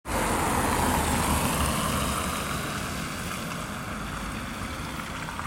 Sound effects > Vehicles
car rain 13
rain, vehicle, car